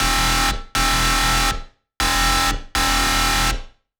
Sound effects > Electronic / Design
02 - Alarms & Beeps - Space Pirates In The Break Room A
Aggressive synthesised alarm sound.
alarm, alert, beep, electronic, sci-fi, scifi, UI